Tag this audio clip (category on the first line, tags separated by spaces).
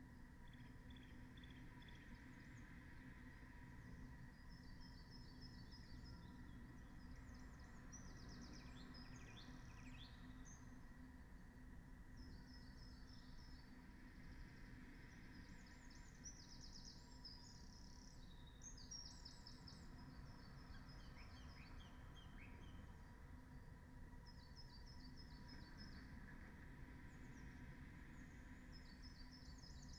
Soundscapes > Nature
weather-data data-to-sound raspberry-pi sound-installation natural-soundscape field-recording alice-holt-forest